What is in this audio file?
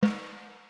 Music > Solo percussion
Snare Processed - Oneshot 91 - 14 by 6.5 inch Brass Ludwig
acoustic, beat, brass, fx, ludwig, perc, percussion, realdrums, rim, rimshots, sfx, snare, snaredrum, snareroll